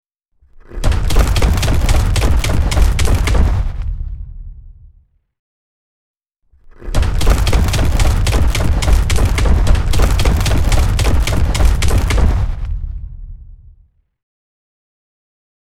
Sound effects > Animals
custom monster footstep charging sounds 09122025
custom giant footstep charging.
humongous, big, hulk, beserker, stomp, step, giant, sprinting, heavy, beast, orc, demon, dinosaur, creature, monster, sprint, charging, giagantic, fantasy, huge, prehistoric, running, animal, animalistic, footstep, foot